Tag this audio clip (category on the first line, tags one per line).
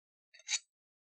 Sound effects > Other
blade
filing
grind
grinding
knife
metal
metallic
scrape
scraping
scratching
sharpen
sharpening
steel